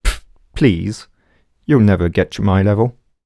Speech > Solo speech
Cocky - pfft please youl never get to my level
cocky, dialogue, FR-AV2, Human, Male, Man, Mid-20s, Neumann, NPC, oneshot, sentence, singletake, Single-take, smug, talk, Tascam, U67, Video-game, Vocal, voice, Voice-acting, words